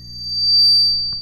Sound effects > Electronic / Design
static Feddback 4
Shotgun mic to an amp. feedback.
shortwave, radio, interference